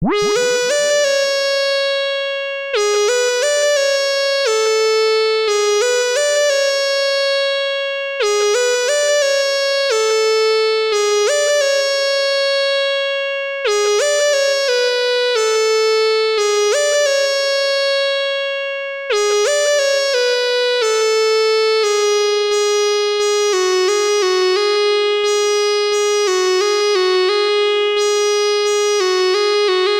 Music > Solo instrument
Synth lead I wrote for a song but didn't end up using, so hey, here it is! Using the UAD Minimoog plugin with a bit of stereo reverb behind it to give some more body. Loop parts of it, get rid of parts of it, do whatever!